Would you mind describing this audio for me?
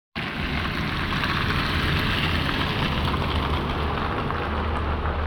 Sound effects > Vehicles
ford fiesta
Car, field-recording, Tampere